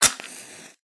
Sound effects > Objects / House appliances
Matchsticks LightMatch 2 Narrative
Lighting a matchstick, recorded with an AKG C414 XLII microphone.
burning-matchstick, fire, matchstick